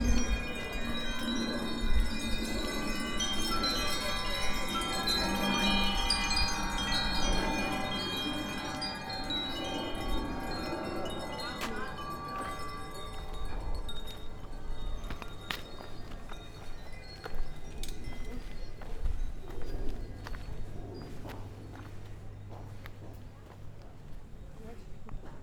Music > Other
Truc Lam Zen Monastery Wind Chimes (Short)
This is short record from souvenir shop at the entrance of Truc Lam Zen monastery in Dalat, Vietnam. Relaxing wind chimes for sfx and meditation.
ambience, buddhism, chimes, enchanted, shop, souvenir, temple, zen